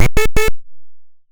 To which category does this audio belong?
Sound effects > Electronic / Design